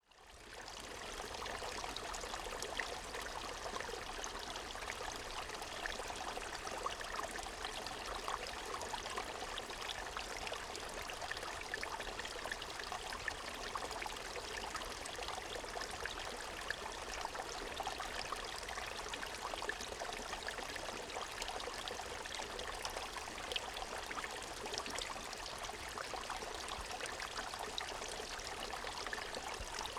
Soundscapes > Nature
MGRec-04 BROOKE - TRICKLING MS CHARLEVOIX
Small stream in Charlevoix, Quebec, Canada. RSM-191, SoundDevice 722.